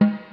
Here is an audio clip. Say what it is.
Music > Solo percussion
Snare Processed - Oneshot 97 - 14 by 6.5 inch Brass Ludwig
acoustic, beat, brass, crack, drum, drumkit, drums, flam, fx, hit, hits, kit, ludwig, oneshot, perc, percussion, processed, realdrum, realdrums, reverb, rim, rimshot, rimshots, roll, sfx, snare, snaredrum, snareroll, snares